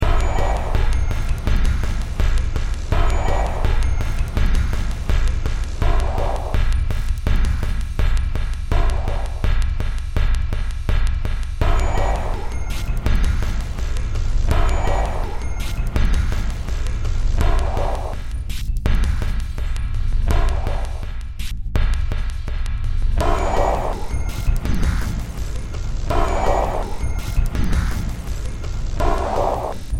Music > Multiple instruments
Short Track #3352 (Industraumatic)
Ambient, Cyberpunk, Games, Horror, Industrial, Noise, Sci-fi, Soundtrack, Underground